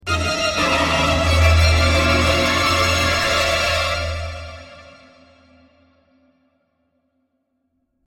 Sound effects > Other
Orchestral Shock Cut Hit 1a
Shock-cut orchestral hits for your scary moments, created with orchestral music and synths. Generated by the AI site, Elevenlabs.